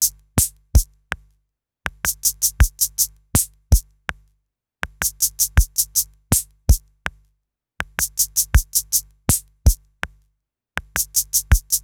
Instrument samples > Percussion
81 Welson Loop 02
Drums, Retro